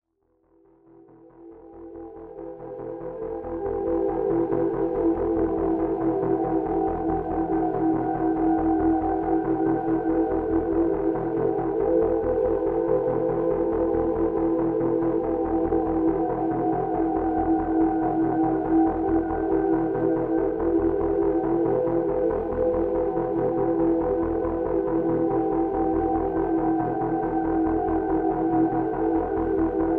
Music > Multiple instruments
crushed-suspense-001
Suspenseful backdrop music with pulsing bass and haunting vocals. Made in FL Studio, 140 bpm.
sinister, thrill, anxious, dramatic, phantom, terrifying, suspense, pads, background, music, choral, movie, background-music